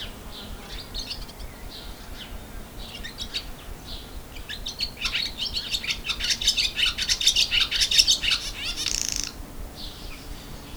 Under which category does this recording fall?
Sound effects > Animals